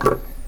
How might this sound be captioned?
Music > Solo instrument
acoustic guitar tap 5
acosutic, chord, chords, dissonant, guitar, instrument, knock, pretty, riff, slap, solo, string, strings, twang